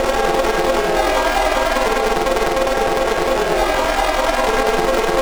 Music > Multiple instruments
Crispy Loop 92bpm
Noisy little melody based on the “Amazing Bubbles” preset for Triple Oscillator in LMMS. Cropped in Audacity since LMMS apparently can't render loops properly.
crispy, lmms, simple, music, electronic-music